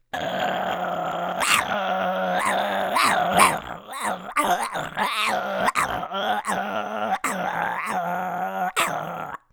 Sound effects > Animals
rabies dog
angry, rabies, dog, animal